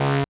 Sound effects > Experimental
from a collection of analog synth samples recorded in Reaper using multiple vintage Analog synths alongside analog delay, further processing via Reaper